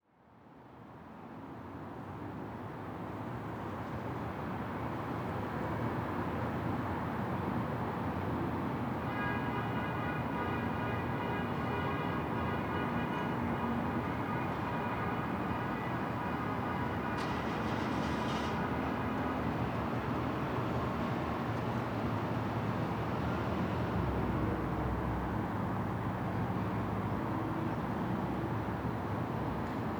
Soundscapes > Urban
A huge bike ride of many thousand people rode by my home, some cheering, talking, bikes with huge sound systems. Recorded from about half a block away.
ambience, bicycle, celebration, crowd, field-recording, music, noise, people, street, traffic, urban